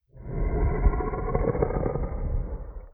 Sound effects > Animals
This sound was recorded and processed with Audacity. It’s my throat voice, I slowed it down and changed the pitch